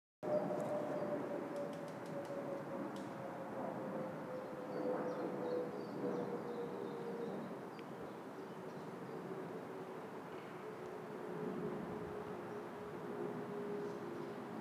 Soundscapes > Urban
Airplane above forest
Stereo recording of an airplane flying over a quiet forest ambience.
Peaceful, Bird, Wind, Field-recording, Day, Nature, Birds, Forest, Traffic, Birdsong, Park, Environment, Trees, Plane